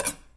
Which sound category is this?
Sound effects > Objects / House appliances